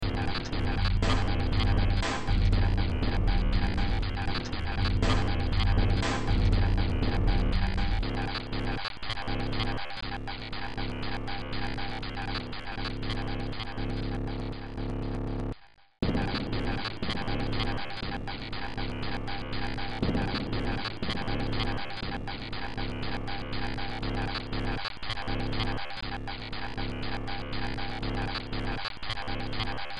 Music > Multiple instruments
Demo Track #3146 (Industraumatic)
Ambient, Cyberpunk, Games, Horror, Industrial, Noise, Sci-fi, Soundtrack, Underground